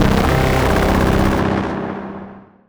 Instrument samples > Synths / Electronic
CVLT BASS 84
clear, wavetable